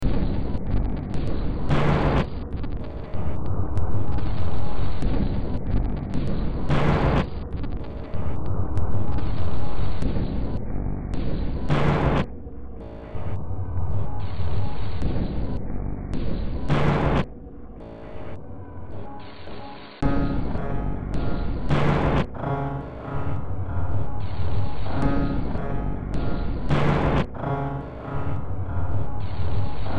Music > Multiple instruments
Demo Track #3756 (Industraumatic)
Ambient, Games, Industrial, Noise, Sci-fi, Soundtrack, Underground